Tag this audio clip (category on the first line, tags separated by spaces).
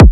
Instrument samples > Synths / Electronic
base bass basedrum percussion bass-drum oneshot kick base-drum one-shot drum drums perc kickdrum kick-drum drum-kit bassdrum